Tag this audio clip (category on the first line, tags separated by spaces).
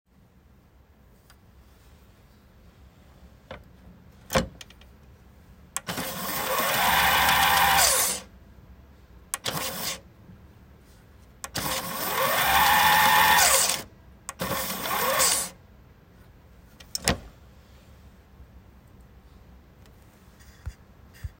Sound effects > Other mechanisms, engines, machines
35mm screen books records images collection plastic library film archive enlarge reader scanner documents optical light microfilm microscopic newspapers research reading